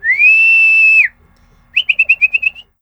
Sound effects > Human sounds and actions
A crowd whistling element.